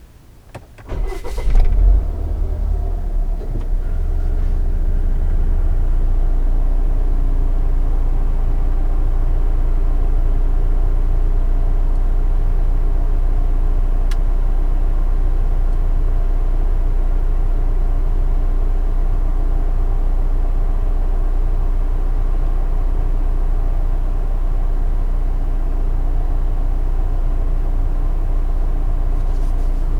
Soundscapes > Other
Car Volvo XC40 Int Start Run Stop Zoom H1n
Car, Int, Start, Run, Volvo, Stop, XC40